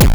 Instrument samples > Percussion
retro bass drum 01023
idk. made in openmpt
hard, bass, kickdrum, retro, bd, drum, kick, hardcore, bass-drum